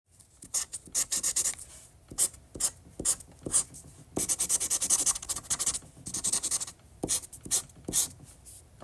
Sound effects > Objects / House appliances
The sounds of a sharpie marker on printer paper. Recorded on an iphone 12 pro max, this sound is not AI.